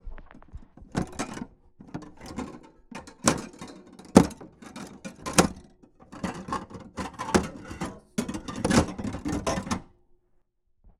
Sound effects > Objects / House appliances
FOODGware-Contact Mic Glass plates moving in the kichen cabin SoAM Sound of Solid and Gaseous Pt 1 Apartment
plate, clank, plates, dishes, kitchen